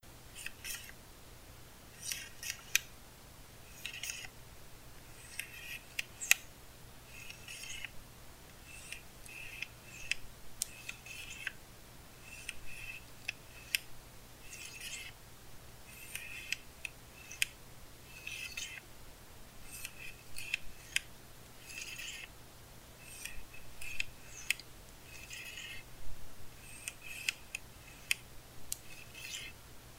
Sound effects > Objects / House appliances
COMRadio-Blue Snowball Microphone Hand Turbine, Antenna, Extend, Retract Nicholas Judy TDC
A hand turbine radio antenna extending and retracting.